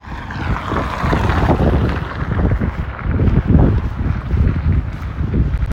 Soundscapes > Urban
Car passing recording 20
The sound originates from a passenger car in motion, generated by the engine and tire–road interaction. It consists of continuous engine noise and tire friction, with a noticeable Doppler change as the car approaches and passes the recording position. The sound was recorded on a residential street in Hervanta, Tampere, using a recorder in iPhone 12 Pro Max. The recording is intended for a university audio processing project, suitable for simple analysis of pass-by sounds and spectral changes over time.